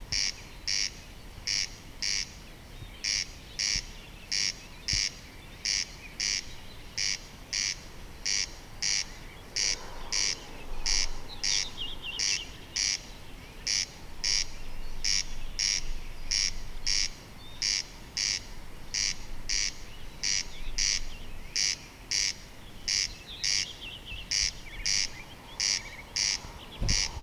Soundscapes > Nature
corncrake and other night birds recorded with Zoom H1n